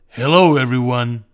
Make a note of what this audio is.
Speech > Solo speech
hello everyone
calm, human, voice